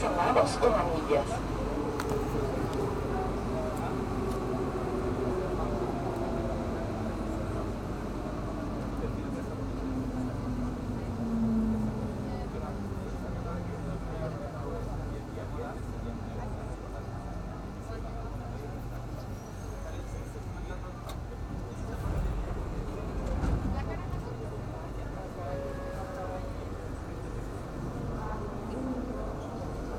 Soundscapes > Urban
AMBIENTE METRO / SUBWAY AMBIENCE
A souldfield recording of a short ride in Chilean subway.
SUBWAY, CHILE, SOUNDFIELD, AMBIENCE, METRO